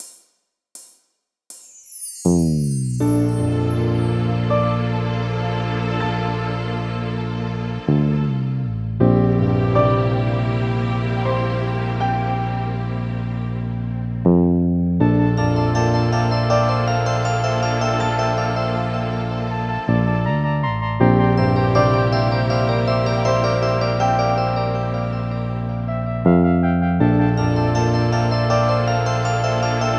Music > Multiple instruments
I felt it'd be interesting to add a beat to it to create a rap ballad instrumental. It has a tempo of 80 bpm and can be looped seamlessly on its own or with the other "Always On My Mind" samples.